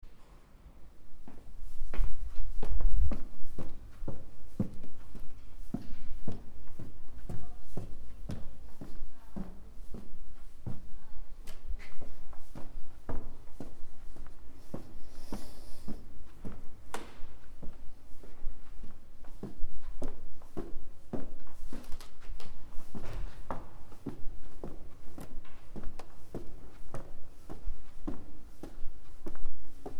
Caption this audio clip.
Sound effects > Human sounds and actions
Footsteps ascending the stairs in the library of the Faculty of Arts and Humanities at the University of Porto. Recorded with a Tascam DR-40X."
library, strair